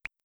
Soundscapes > Nature
Created for the video game DystOcean, I made all sounds with my mouth + mixing.
Pop, Bubble, Bubbles